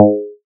Instrument samples > Synths / Electronic
FATPLUCK 2 Ab
additive-synthesis, bass